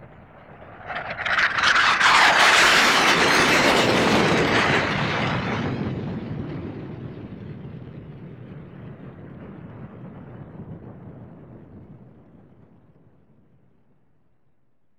Sound effects > Other mechanisms, engines, machines
Afterburner (Close, Screamer, Quick Onset)
High-intensity jet afterburner sound effect featuring a deep, roaring engine tone with layered low-frequency thrust and turbulent air movement. Captures the raw power and pressure of a military jet engine at full output. Ideal for aircraft, aviation, or cinematic sound design. Clean stereo mix, ready for editing or layering. Original generated via Adobe Firefly, modded with Audition.
ai-generated, aircraft